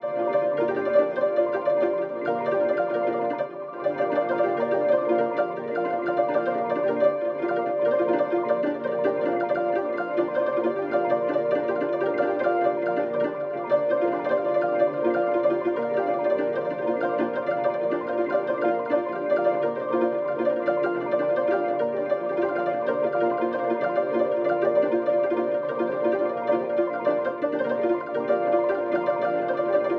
Soundscapes > Synthetic / Artificial
Botanica-Granular Ambient 9
Ambient, Atomosphere, Beautiful, Botanica, Botanical